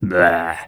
Speech > Solo speech
Yuck! That's disgusting! Male vocal recorded using Shure SM7B → Triton FetHead → UR22C → Audacity → RX → Audacity.